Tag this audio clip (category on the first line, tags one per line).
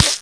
Sound effects > Human sounds and actions
squelch
mud
organic
cartoon
squish
videogame
funny
game
mouth
splat
booger
clay